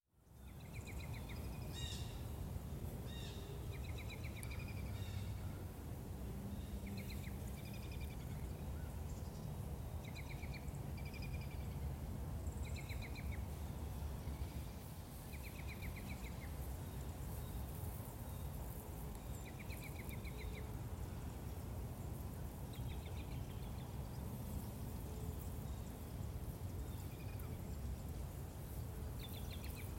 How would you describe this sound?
Soundscapes > Nature
Recorded backyard ambience using my iPhone 11, edited in Ableton. Birdsongs from Southern Central Ontario.